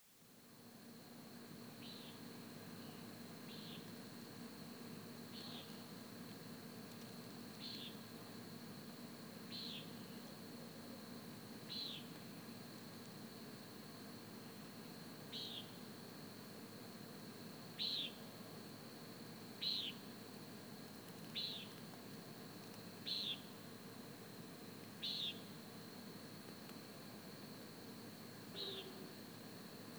Soundscapes > Nature
Nighthawk before dawn Sony D100 MAY 21 2025 vTWO
The joy of recording audio is that you can set up a recorder, then go on and do other things like write, garden, wash dishes, heck, you can even participate in this wonderful hobby as you sleep! That is exactly - and happily - what happened to me recently. I set up my Sony PCM D100 and its built-in microphones oriented in X-Y in the weeds at the edge of a small town park. I then went to sleep. Imagine my great surprise the next morning then when I discovered I had " captured " one of my favorite sounds which, to me, is representative of summer: the call of the Common Nighthawk. The scientific name of this mosquito eater is Chordeiles minor. From what I could estimate from my timeline, about 2:30 in the morning this Nighthawk started flying slow circles around the park neighborhood and began its sad-sounding single note call. Enjoy this sound of summer!
Birds, Peaceful, Nature, Chordeiles, Chordeiles-minor, Overnight, Forest, Bird, Nighthawk, Park